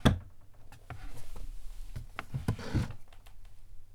Sound effects > Objects / House appliances
Wooden Drawer 07
wooden, open